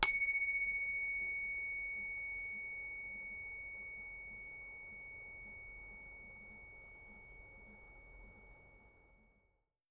Sound effects > Objects / House appliances
BELLMisc-Blue Snowball Microphone, CU Meditation Chime, Ring Nicholas Judy TDC
A meditation chime ringing.
chime, ring, Blue-brand, meditation, Blue-Snowball